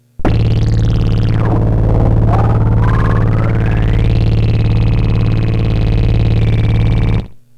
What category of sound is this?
Sound effects > Electronic / Design